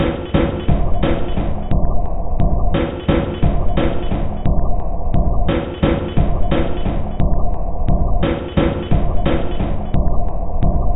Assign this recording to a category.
Instrument samples > Percussion